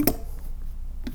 Sound effects > Objects / House appliances
knife and metal beam vibrations clicks dings and sfx-082
Beam, Clang, ding, Foley, FX, Klang, Metal, metallic, Perc, ting, Trippy, Vibrate